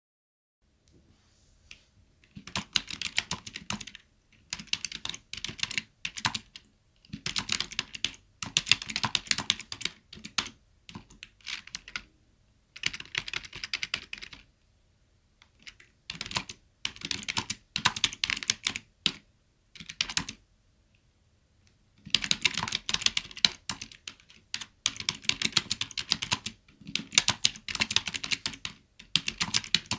Sound effects > Objects / House appliances
Keyboard typing 01
I typed on my Logitech MK370 keyboard at my desk. Desk is made from pressed wood with plastic cover on top. - Quality: bit of reverb from the lack of sound-proofing.